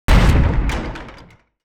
Sound effects > Other
Sound Design Elements Impact SFX PS 086
audio, percussive, hard, shockwave, game, design, collision, transient, explosion, force, heavy, impact, sfx, cinematic, strike, blunt, sound, effects, thudbang, smash, sharp, crash